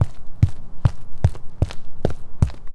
Sound effects > Human sounds and actions
Footsteps On A Rooftop (Mic Between Lesg), Tascam Portacapture X8
Walking on a rooftop, mic was placed between feet
bitumen, footsteps, rooftop, steps, walking